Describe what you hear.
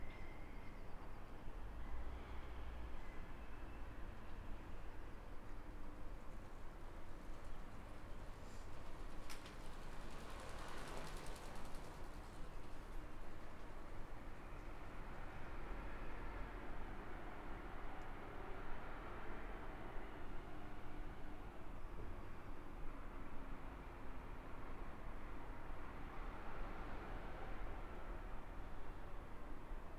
Soundscapes > Urban
AMBTown Quiet street between apartments with a few pedestrians, in the morning, Karlskrona, Sweden
Recorded 08:33 07/05/25 Not that much activity in this street. A few pedestrians pass, in the beginning a bicyclist. A light breeze is heard in the first two minutes. The only car sounds are distant or occasional cars turning on a nearby street, also a garbage truck There’s also some common birds like crows and seagulls. Zoom H5 recorder, track length cut otherwise unedited.
Ambience, Background, Birds, Breeze, Car, Crow, Dove, Field-Recording, Garbage, Karlskrona, Morning, Pedestrians, Quiet, Seagull, Street, Sweden, Town, Truck, Urban, Walking